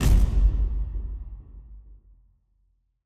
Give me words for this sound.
Sound effects > Electronic / Design
Take Damage (Starbase) 1
A sound effect that was produced for a space-shooter game called "F.L.O.P" that was submitted to the 2025 GMTK Gamejam. It occurs when a starbase the hero protects is hit by an enemy attack.
damage-impact, damage-sound, projectile-hit, spaceship-damage, spaceship-damage-sound, spaceship-hit, spaceship-hit-sound, space-shooter-hit, starbase-damage, starbase-damage-sound, starbase-hit, starbase-hit-sound, starship-hit, starship-hit-sound, sustain-damage, take-damage, torpedo-hit, torpedo-hit-sound, torpedo-impact, torpedo-impact-sound